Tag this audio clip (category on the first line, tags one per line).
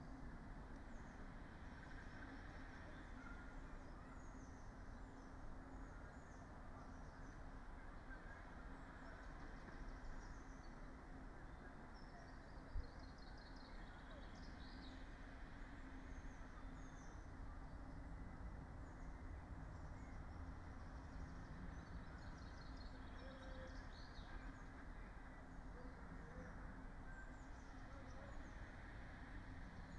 Soundscapes > Nature
data-to-sound
Dendrophone
modified-soundscape
nature
phenological-recording
soundscape